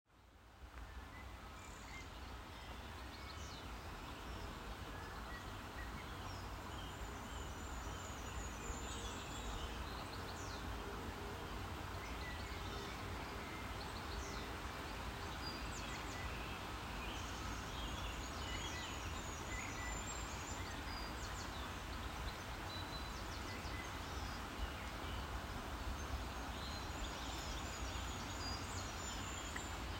Soundscapes > Nature
Summer Forrest ambience, Balcome, East Sussex, UK
Forrest ambience, recording in a forrest near Balcombe, East Sussex - UK. 6/6/25
birds, birdsong, field-recording, forrest, nature, summer, UK